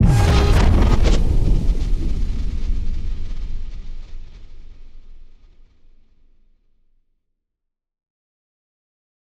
Sound effects > Other
Sound Design Elements Impact SFX PS 121
percussive, impact, collision, force, power, transient